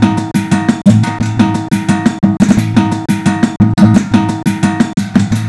Music > Other
ethno drum 175 bpm
africa, drumloop, ethnique, ethno, loop, rhythmic, world